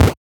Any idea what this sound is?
Instrument samples > Percussion
8 bit-Noise Percussion8
8-bit, FX, game, percussion